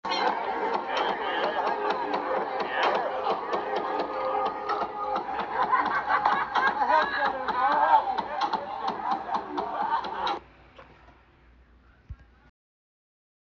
Sound effects > Human sounds and actions
birthday party recording interaction.
people, birthday